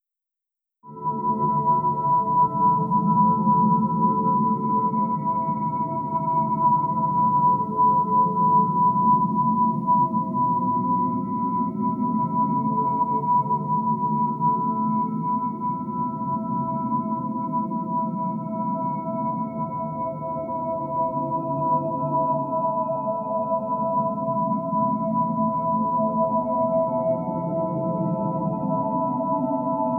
Soundscapes > Synthetic / Artificial
Magic - Unpleasant Tonal Ambience
Tonal chime ambience with a delicate but unpleasant and sustained whistling effect. The atmosphere was designed for a moment of recovery, a healing spell, or exploring a mysterious place filled with strange floating lights. This might work well to create mystery, tension and suspense... DAW : Audacity. No pre-made loops. VSTs : Paulstretch. Instruments : Tonal chimes AI-generated with Myedit AI (paulstretched factor 6 and then reversed), Gold coins - Toss, Heads or tails -> my own sound n°770098 (paulstretched and wahwah). BPM 136 (but the track hasn't been processed with quantization). Key : E minor * Experimental sound. * Magic atmosphere.
wizard, adventure, magic, fairy, sparks, magical, game-sound, safe, witch, dungeon, recovery, sanctuary, cure, temple, rpg, game, spell, tonal, magician, healing, gothic, shrine, fantasy, priest, heal, donjon